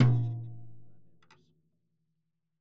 Music > Solo percussion
med low tom- oneshot buzz 12 inch Sonor Force 3007 Maple Rack
acoustic, beat, drum, drumkit, drums, flam, kit, loop, maple, Medium-Tom, med-tom, oneshot, perc, percussion, quality, real, realdrum, recording, roll, Tom, tomdrum, toms, wood